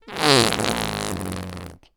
Sound effects > Human sounds and actions
Imitation of a fart done by my kid who is blowing air on his arm :)
Fart, field-recording, flatulate, flatulation, flatulence, gas, noise, poot, prrrr